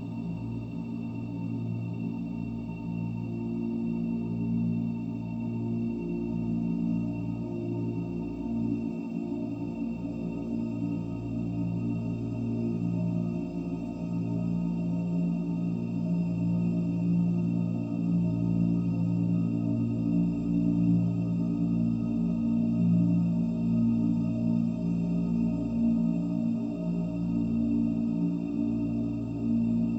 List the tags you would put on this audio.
Soundscapes > Synthetic / Artificial
Dark-Atmosphere
Horror
Horror-Ambience
Slasher

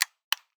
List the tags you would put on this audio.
Sound effects > Human sounds and actions
activation
button
click
interface
off
switch
toggle

